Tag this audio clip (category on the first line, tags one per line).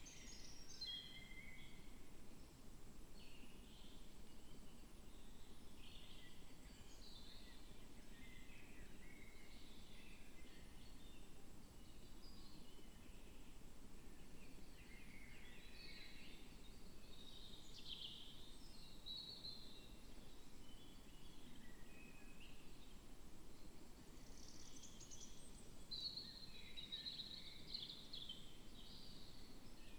Soundscapes > Nature
modified-soundscape
raspberry-pi